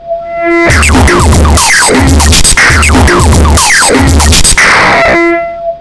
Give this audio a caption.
Music > Multiple instruments
A Caustic Mess
acid, caustic, distortion, drums, fizzel, fizzelmakesnoise, loops, synths